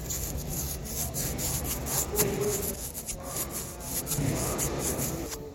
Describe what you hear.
Sound effects > Objects / House appliances
SPRTIndor-Samsung Galaxy Smartphone, MCU Billiards, Cue, Chalk Nicholas Judy TDC
Chalking a pool cue.
billiards, pool, Phone-recording, chalk, foley, cue